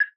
Sound effects > Electronic / Design
All sample used from bandlab. I just put a drumfill into phaseplant granular, and used Flsudio ''Patcher'' plugin to add multiple phaser, Vocodex, and flanger, because I was really boring. Extra plugin used to process: OTT.
Effect, Select